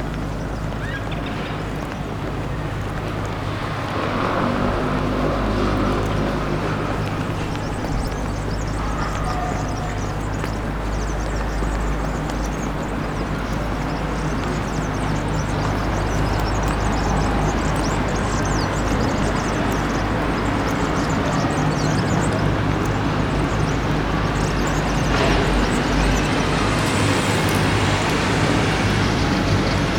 Soundscapes > Urban
20251024 EMAV Cars Nature Birds Nice Energetic
Birds, Cars, Energetic, Nature, Nice